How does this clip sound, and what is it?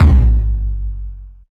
Instrument samples > Percussion
BrazilFunk Percussion 2
Sample used a 707 cowbell from Flstudio orginal sample pack. Processed with Zl EQ, Waveshaper.